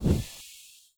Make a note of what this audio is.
Sound effects > Other mechanisms, engines, machines
processed audio of blowing onto the mic. can be used as flamethrower hissing, or fire being extinguished